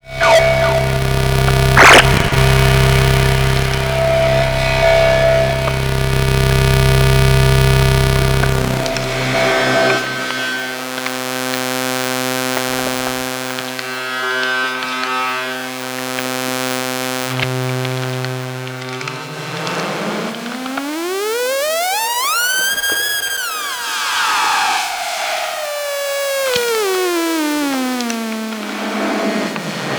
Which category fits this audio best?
Sound effects > Electronic / Design